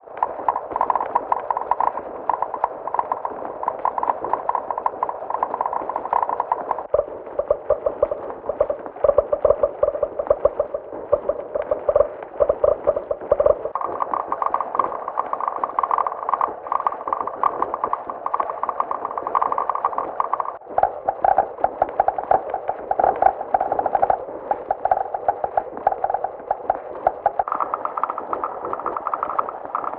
Sound effects > Objects / House appliances
Boiling Water2
Bubble, Boiling, Water